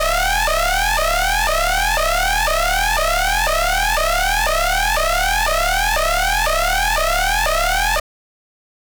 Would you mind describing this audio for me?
Sound effects > Electronic / Design
A designed alarm SFX created in Phaseplant VST.
alarm, danger, alert, repeating, sci-fi, warning, error